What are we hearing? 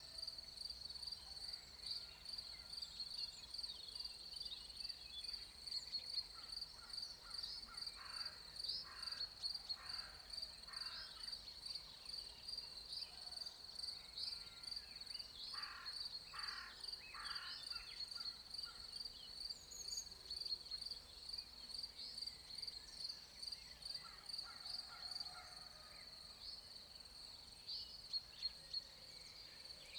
Soundscapes > Nature
Grillons Chateau Matin
Crickets (or more generally orthoptera) in the morning in the village of Chateau, Bourgogne, France. Birds in the background (among them, blackbirds, black redstart, blackcap, chaffinch, pigeons, a rooster). Some light sounds of nearby sheep. Passing car, a plane, some light wind. 2xEM272 electret mics in ~1m AB stereo.
crickets, insects, orthoptera, morning, field-recording, dawn